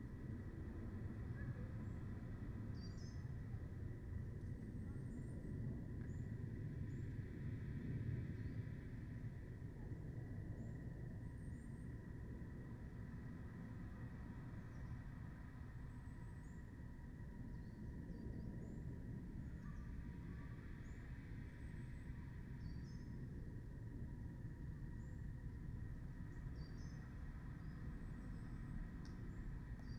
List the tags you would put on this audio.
Soundscapes > Nature
alice-holt-forest artistic-intervention Dendrophone field-recording natural-soundscape raspberry-pi

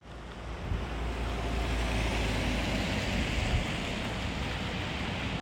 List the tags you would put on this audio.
Soundscapes > Urban
traffic vehicle car

